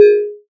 Instrument samples > Synths / Electronic
CAN 2 Ab
additive-synthesis, bass, fm-synthesis